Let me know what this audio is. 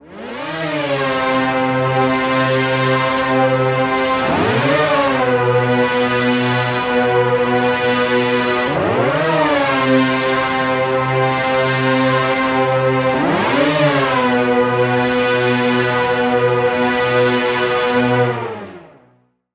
Sound effects > Electronic / Design
A heavy, aggressive industrial synth drone or bass line. It features deep, distorted low-end frequencies with a gritty, pulsating texture that conveys a sense of force and unyielding momentum.
bass, synthetic, fx, sound-effect, drone, electronic, sound-design